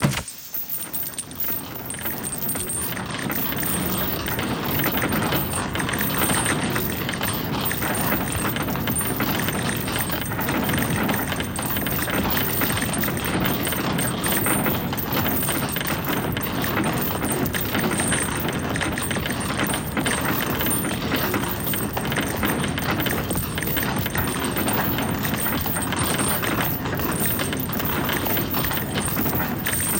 Sound effects > Other mechanisms, engines, machines
Heavy Chain&Gears Moving 1
Hi! That's not recordedsound :) I synthed it with phasephant!